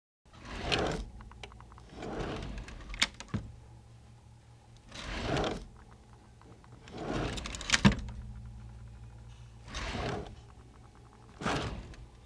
Sound effects > Objects / House appliances
Wood Drawer Opens and closes
Drawer, Close, Open